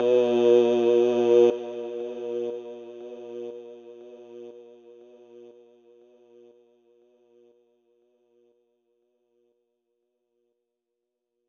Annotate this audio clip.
Speech > Other
monotone vocal
yell, pain, scream